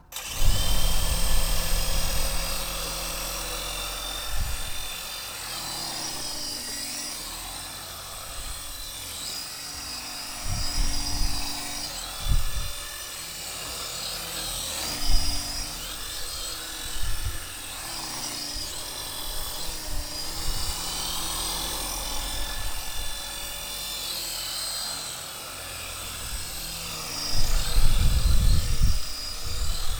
Sound effects > Objects / House appliances
AERORadio-Blue Snowball Microhpnoe, CU Helicopter, RC, Start, Flying, Some Wind Noises, Shut Off Nicholas Judy TDC
An RC helicopter start, flying around with some muffled wind noises and shut off.
around, Blue-brand, Blue-Snowball, fly, helicopter, muffled, rc, shut-off, start, whoosh, wind